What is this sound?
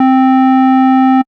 Instrument samples > Synths / Electronic
04. FM-X ODD1 SKIRT3 C3root
FM-X, Montage, Yamaha, MODX